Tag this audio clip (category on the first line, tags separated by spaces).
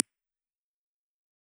Sound effects > Other
Timbre shure IR Deconvoluted-Sinesweep Tone Sm57 Amp-sim Impulse-Response Tone-IR bluetooth-speaker cheap Speaker-simulation